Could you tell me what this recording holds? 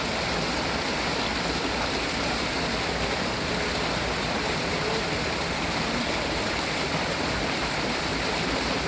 Sound effects > Natural elements and explosions
WATRFoun-Samsung Galaxy Smartphone, CU Short Pump Town Center-Water Fountain Nicholas Judy TDC
A water fountain running. Looped. Recorded at Short Pump Town Center.
loop, water